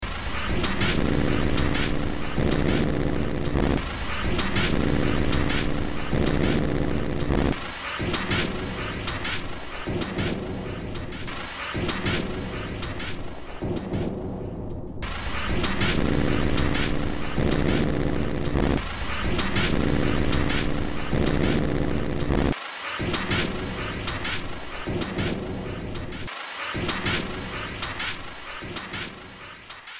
Music > Multiple instruments
Demo Track #3867 (Industraumatic)
Soundtrack, Underground